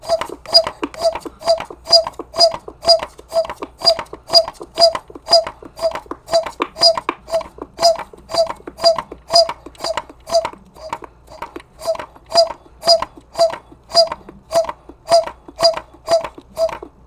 Sound effects > Objects / House appliances
A cartoon bike pump.